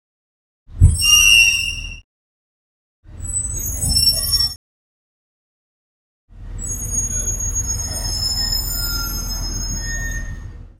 Sound effects > Vehicles
Trolley Brakes
The sound of a large trolley's rusty brakes. A harsh, metallic squeak/screech that could be used for the sound of brakes or other machinery, or could maybe serve as a dramatic sting sound effect.